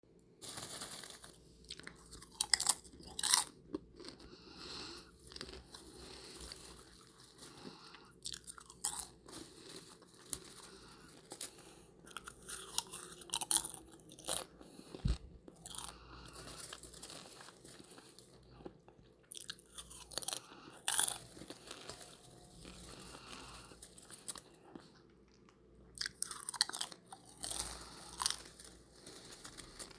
Sound effects > Human sounds and actions
Popcorn Eating
My own recording of a girl (me) taking popcorns from a bowl and eating them. Recorded whith the microphone of a Samsung Galaxy S23 Ultra smartphone.
eating, food, snack, chips, crunch, chew, eat, bite, munch, corn, popocorn